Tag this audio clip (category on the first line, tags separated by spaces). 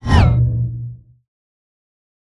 Sound effects > Other
audio trailer elements effect swoosh film whoosh production fx movement transition design ambient dynamic sweeping fast sound cinematic motion element effects